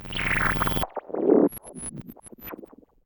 Sound effects > Experimental
Analog Bass, Sweeps, and FX-139
alien, analog, analogue, bass, dark, electro, electronic, fx, machine, oneshot, pad, sci-fi, snythesizer, sweep, synth, vintage, weird